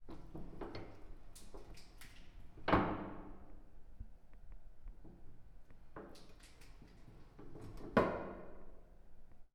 Sound effects > Objects / House appliances
Bomb shelter door locking mechanism 3
In the basement of our apartment building, there is a bomb shelter with heavy metal doors, kind of like submarine doors. This is the sound of its locking mechanism.